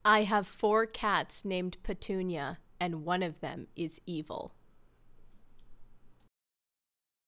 Speech > Solo speech
A female saying a phrase
female, speech, voice